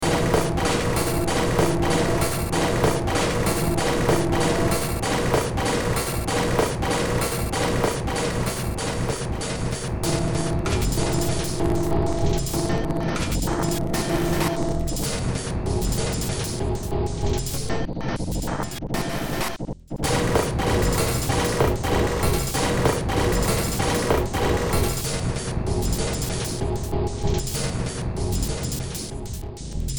Music > Multiple instruments
Short Track #3686 (Industraumatic)
Horror, Soundtrack, Noise, Ambient, Underground, Games, Industrial, Sci-fi, Cyberpunk